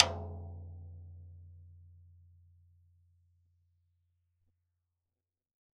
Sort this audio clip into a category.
Music > Solo percussion